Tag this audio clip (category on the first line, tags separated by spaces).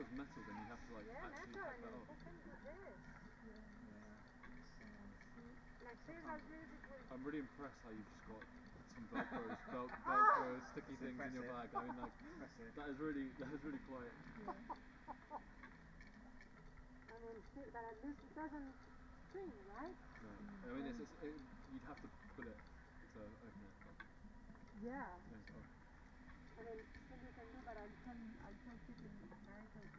Soundscapes > Nature
alice-holt-forest nature modified-soundscape natural-soundscape field-recording soundscape weather-data phenological-recording Dendrophone raspberry-pi artistic-intervention data-to-sound sound-installation